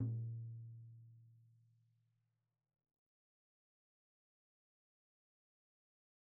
Music > Solo percussion
Med-low Tom - Oneshot 48 12 inch Sonor Force 3007 Maple Rack
beat, drum, drumkit, drums, flam, kit, loop, maple, Medium-Tom, oneshot, perc, percussion, real, realdrum, recording, roll, Tom, tomdrum, toms, wood